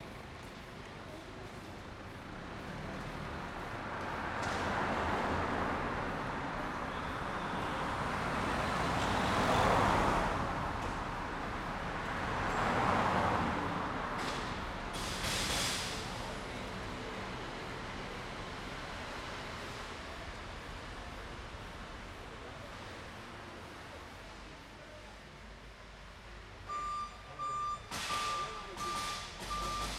Sound effects > Human sounds and actions
MALLORCA TOWN 06
Recorded around a mixed-use neighbourhood of Palma in the early evening. 4 lanes of traffic including busses driving by, the banging of flower market being dismantled can be heard sometimes. People talking etc. Recorded with a Zoom H6, compressed slightly
ambience, background, foley, mallorca, town